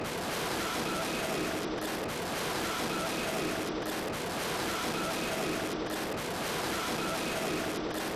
Instrument samples > Percussion
This 235bpm Drum Loop is good for composing Industrial/Electronic/Ambient songs or using as soundtrack to a sci-fi/suspense/horror indie game or short film.
Drum, Loopable, Ambient, Weird, Soundtrack, Alien, Underground, Industrial, Loop, Dark, Samples, Packs